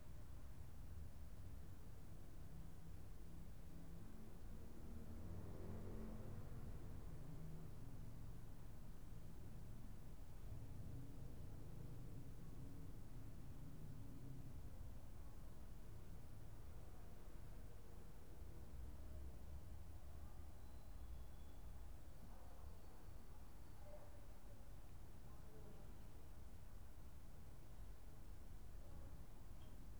Soundscapes > Indoors
Large Room Daytime Ambience
A spacious daytime room ambience recorded in Tbilisi, featuring distant city traffic, subtle wooden floor clicks, and the characteristic soundscape of a Georgian courtyard. Natural, airy, and lightly urban. If you’d like to support my work, you can get all my ambience recordings in one pack on a pay-what-you-want basis (starting from just $1). Your support helps me continue creating both free and commercial sound libraries! 🔹 What’s included?
room, city, traffic